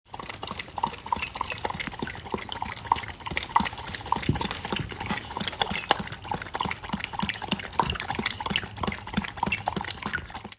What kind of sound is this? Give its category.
Sound effects > Animals